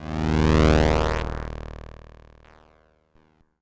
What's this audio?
Sound effects > Experimental
Analog Bass, Sweeps, and FX-131
analog, bass, basses, bassy, complex, dark, effect, electro, electronic, fx, korg, mechanical, pad, retro, robotic, scifi, snythesizer, synth, trippy, weird